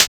Instrument samples > Percussion
8 bit-Noise Snare Stick2
Hi ! Game Designers! I can't wait to see that how cool is it~! And, I synth it with phasephant!
FX, game, percussion, 8-bit